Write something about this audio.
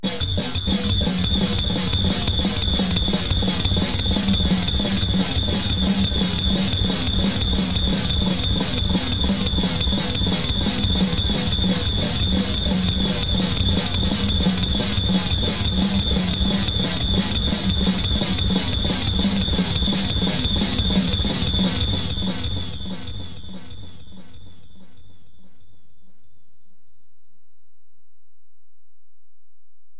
Music > Solo percussion
Simple Bass Drum and Snare Pattern with Weirdness Added 014
It's pretty much all in the name (and the tags). I took a simple 4/4 beat, snare on 1 and 3, bass on 2 and 4, and then I added erratic chains of effects that I primarily determined aleatorically. The result is sometimes noisy, sometimes it's fun or simply strange, but perhaps it could be useful to you in some way.